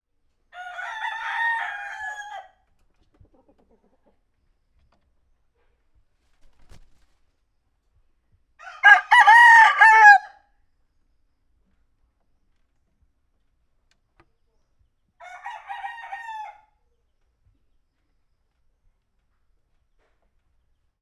Sound effects > Animals
250820 103850 PH Roosters
Roosters. (Take 1) Recorded in the surroundings of Santa Rosa (Baco, Oriental Mindoro, Philippines)during August 2025, with a Zoom H5studio (built-in XY microphones). Fade in/out applied in Audacity.
ambience, atmosphere, chicken, chickens, cock-a-doodle-doo, countryside, farm, field-recording, morning, Philippines, rooster, roosters, Santa-Rosa, SFX